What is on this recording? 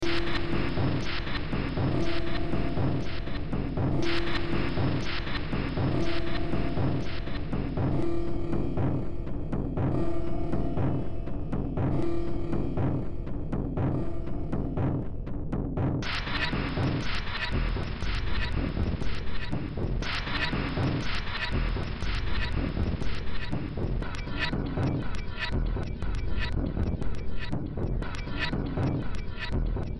Music > Multiple instruments
Demo Track #3281 (Industraumatic)
Ambient, Noise, Cyberpunk, Sci-fi, Underground, Soundtrack, Industrial, Horror, Games